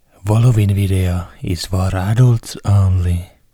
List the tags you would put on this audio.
Speech > Solo speech
calm commercial disclaimer human male man voice

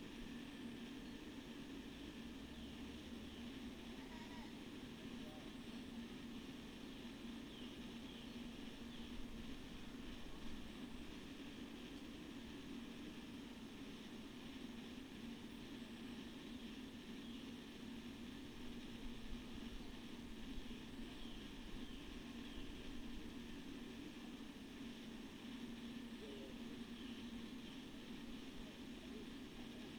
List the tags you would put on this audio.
Soundscapes > Nature
artistic-intervention phenological-recording field-recording data-to-sound nature sound-installation alice-holt-forest modified-soundscape Dendrophone weather-data natural-soundscape raspberry-pi soundscape

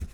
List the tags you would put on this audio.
Sound effects > Objects / House appliances
kitchen plastic water foley clang bucket household fill garden debris slam lid scoop handle container tip hollow carry spill object drop metal pour clatter liquid pail shake tool knock cleaning

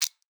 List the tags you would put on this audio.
Sound effects > Human sounds and actions
activation click

